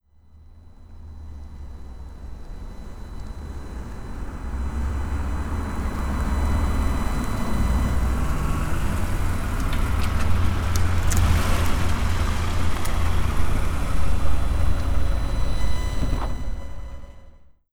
Sound effects > Vehicles
VEHCar-Tascam DR05 Recorder, CU Toyota Highlander, Approach, Stop Nicholas Judy TDC
A Toyota Highlander approaching and stopping.
approach, car, stop, Tascam-brand, Tascam-DR-05, Tascam-DR05, toyota-highlander